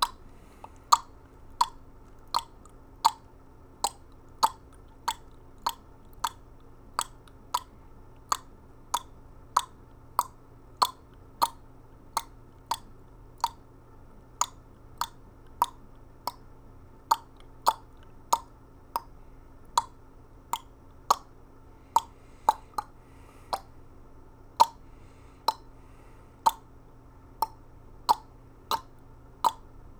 Sound effects > Human sounds and actions
TOONMisc-Blue Snowball Microphone Mouth Clicks Nicholas Judy TDC
Blue-Snowball, cartoon, click, mouth, Blue-brand